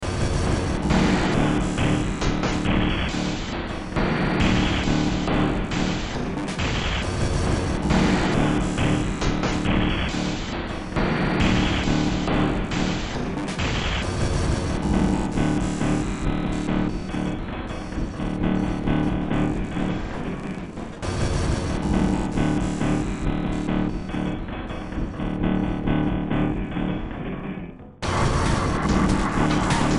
Music > Multiple instruments
Demo Track #3280 (Industraumatic)
Ambient, Cyberpunk, Games, Horror, Industrial, Soundtrack, Underground